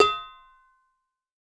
Sound effects > Objects / House appliances
Big pot top 1
A single-hit on the top of a large metal pot with a drum stick. Recorded on a Shure SM57.
hit, metal, metallic, percussion, percussive, single-hit